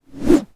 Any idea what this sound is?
Sound effects > Natural elements and explosions
Stick - Whoosh 11 (Reverse)
FR-AV2, stick, swinging, Transition, Woosh, NT5, reverse, whoosh, SFX, whosh, fast, Swing